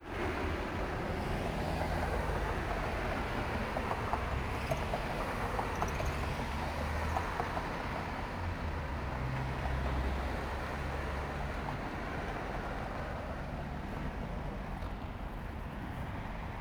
Soundscapes > Urban
A busy road at dusk. Some crickets and birds can be heard. The road is bumpy so you can hear the cars bumping up and down softly in the distance.
road, thump, street, traffic, cricket, cars, field-recording